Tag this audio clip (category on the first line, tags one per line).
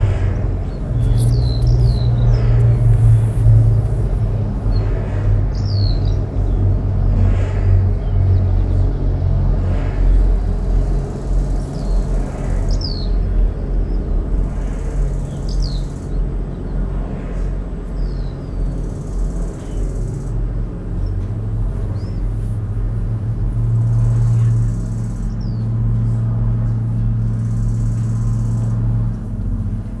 Soundscapes > Indoors
biennale
bird
birds
drone
exhibition
field-recording
low-frequency
metal
metallic
museum
nature
rumbling
spring